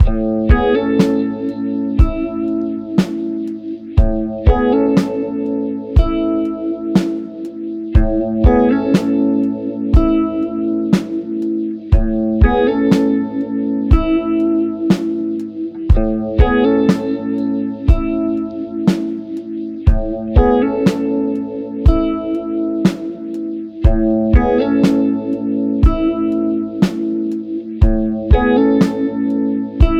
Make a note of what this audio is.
Music > Multiple instruments
Guitar loop played on a cheap guitar. This sound can be combined with other sounds in the pack. Otherwise, it is well usable up to 4/4 60.4 bpm.